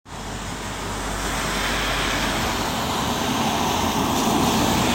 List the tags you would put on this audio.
Sound effects > Vehicles
car field-recording tampere

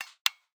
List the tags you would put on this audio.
Sound effects > Human sounds and actions
switch off toggle click interface activation button

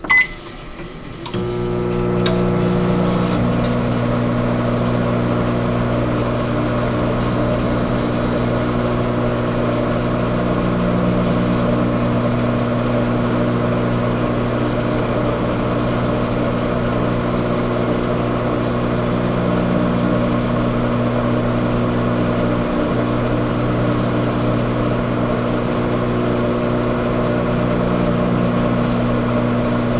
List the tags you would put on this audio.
Sound effects > Objects / House appliances
cooking radiation microwave appliance kitchen oven

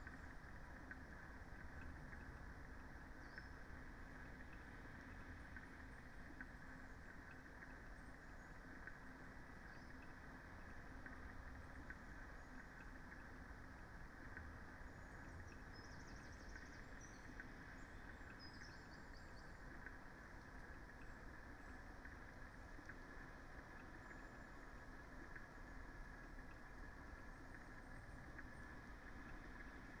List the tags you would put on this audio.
Soundscapes > Nature
data-to-sound Dendrophone field-recording modified-soundscape natural-soundscape nature phenological-recording weather-data

